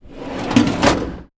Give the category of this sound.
Sound effects > Other mechanisms, engines, machines